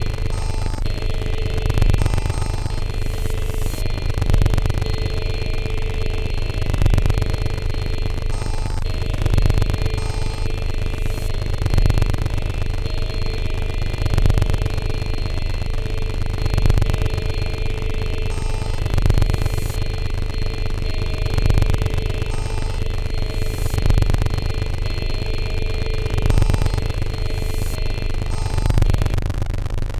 Sound effects > Electronic / Design
Dustmite Chorale 7
The 'Dustmites Chorale' pack from my 'Symbiotes' sampler is based on sounds in which the dominant feature is some form of surface noise, digital glitch, or tape hiss - so, taking those elements we try to remove from studio recordings as our starting point. This excerpt features a lovely psycho-acoustic effect courtesy of rhythmic, panning pulsar wavelets obscuring some form of strange tonal content (FM synthesized bell tones, I believe).
pulsar-synthesis, crackle, panning, noise, tactile, dust, mesmerism, surface, wavelets